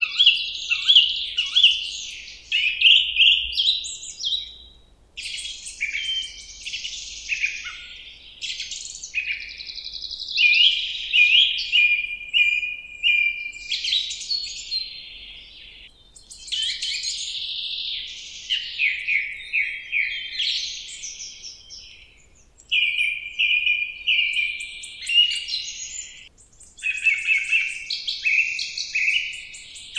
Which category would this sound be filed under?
Sound effects > Animals